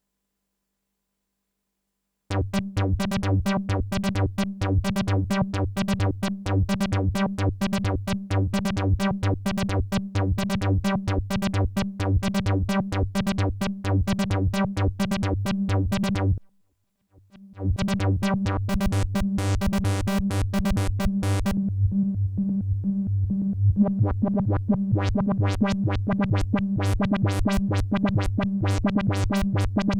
Music > Solo instrument
Uno Synth Preset 1-50 - 130 bpm loops
synth, house, uno, techno, lead, loops, 130, ik, bass, bpm